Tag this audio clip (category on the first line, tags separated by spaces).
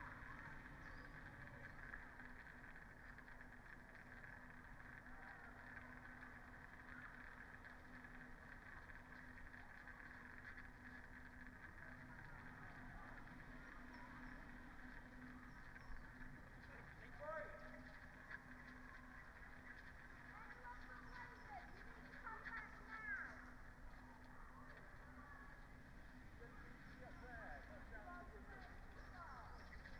Soundscapes > Nature
natural-soundscape modified-soundscape nature field-recording sound-installation artistic-intervention